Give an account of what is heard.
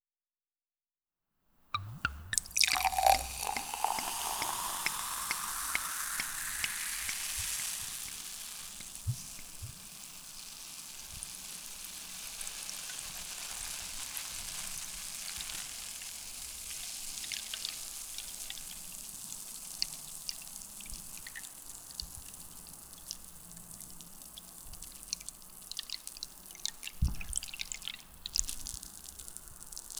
Sound effects > Objects / House appliances
Serving Champagne on Glass 3
Serving a full bottle of champagne in a glass. Can clearly make out the initial "gloob gloob" and afterwards the "fizz" of the bubbles.